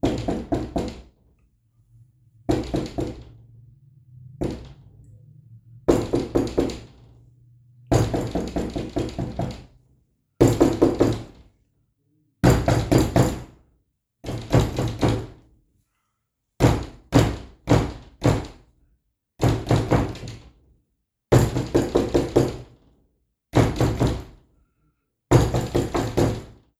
Sound effects > Objects / House appliances
DOORKnck-Samsung Galaxy Smartphone, CU Master Bathroom Door, Knocks, Pounds Nicholas Judy TDC
A master bathroom door knocks and pounds.
door, foley, knock, Phone-recording, pound